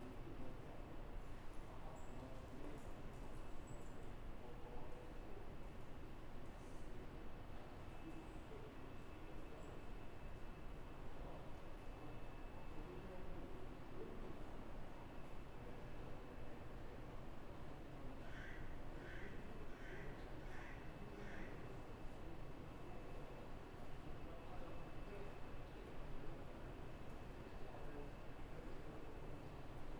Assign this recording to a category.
Soundscapes > Urban